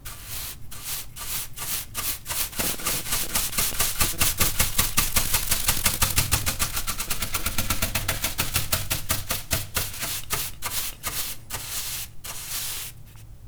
Sound effects > Objects / House appliances
using paint brush to make perc sounds and beats